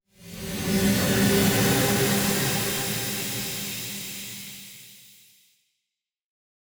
Sound effects > Electronic / Design
A simple, reverse magic SFX designed in Reaper with various plugins.